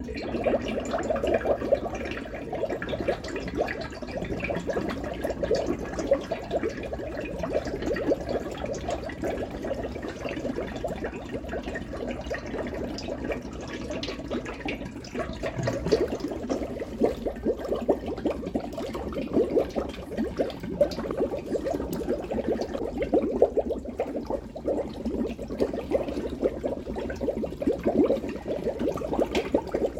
Sound effects > Natural elements and explosions
WATRBubl-Samsung Galaxy Smartphone, CU Large Cauldron Bubbling Nicholas Judy TDC
Large cauldron water bubbling.
bubbles, cauldron, large, Phone-recording, water